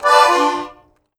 Music > Multiple instruments
MUSCInst-Blue Snowball Microphone, CU Accordion, Slide Down Nicholas Judy TDC
An accordion sliding down.
accordion, Blue-brand, Blue-Snowball, down, slide